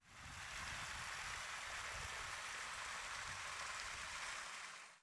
Soundscapes > Nature
water on rocks
# 00:05:037 water sound
water, fiedrecording, rocks